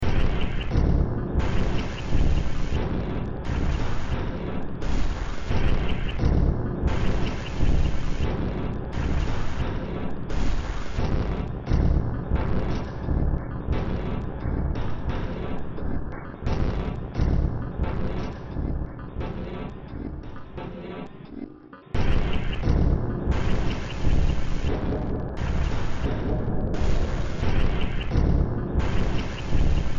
Music > Multiple instruments
Demo Track #2947 (Industraumatic)
Ambient, Horror, Sci-fi